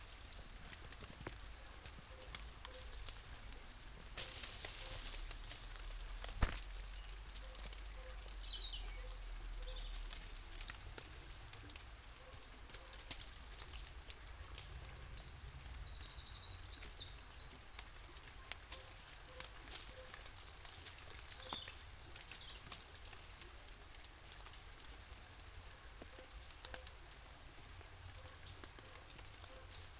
Soundscapes > Nature
Neige Villy voisinage
A snowy morning, some snow falling from branch trees, the neighbours' dog is barking from their house, birds singing (doves, crows...), a little water stream in the background. A couple of trucks passing. Une matinée de neige, des paquets de neige chutent des arbres, un chien aboie depuis une maison voisine, des oiseaux chantent, un ruisseau coule, des véhicules passent. (recorded at high gain, EM272 AB stereo, march 2025, Bourgogne, France)